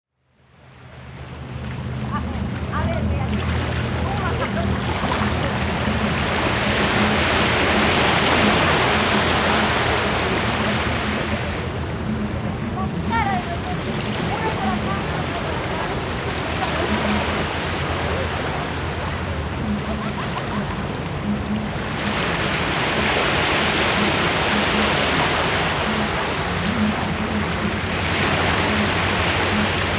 Soundscapes > Nature
Playa Mauá Montevideo
People, waves and seagulls at the Maua beach of Montevideo, Uruguay.
ambient, america, beach, field-recording, montevideo, water